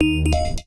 Sound effects > Electronic / Design
UI SFX created using Vital VST.
alert, button, Digital, Interface, menu, message, notification, options, UI